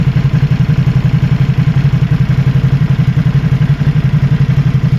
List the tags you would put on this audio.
Sound effects > Other mechanisms, engines, machines
Motorcycle
Supersport

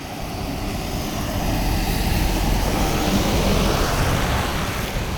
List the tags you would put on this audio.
Sound effects > Vehicles
vehicle
transportation
bus

